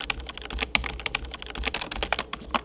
Sound effects > Objects / House appliances
keyboard typing loop1
keyboard typing made into a loop. recorded by me. edited in Audacity.
type, typing